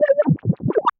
Sound effects > Experimental
Analog Bass, Sweeps, and FX-200
alien, analog, analogue, basses, bassy, complex, dark, electro, electronic, fx, korg, machine, mechanical, retro, robot, sci-fi, scifi, sfx, snythesizer, synth, trippy